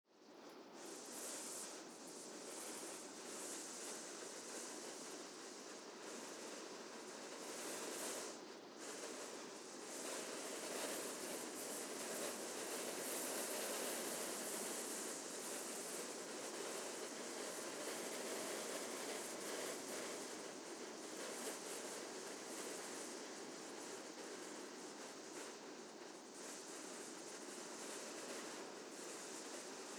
Sound effects > Natural elements and explosions
gusts on plastic net 2
Gusts on plastic net. A plastic net is set in a appropriated place under a stong wind during low tide.
wind, weather, storm, net, Villard, plastic, effect, windy, gust, field-recording, gusts, gale, natural